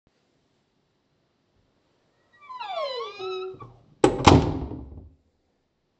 Soundscapes > Indoors
Creaking wooden door v09
Creaking wooden room door closes
Door, Room, Wooden